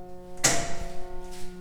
Sound effects > Other mechanisms, engines, machines
Lock click in steel door
A sharp, short, mechanical, steel click against the background of the hum. Steel door. Locking mechanism. Lock in a steel door in the entrance. Recorder: Tascam DR-40. XY.
hum, noise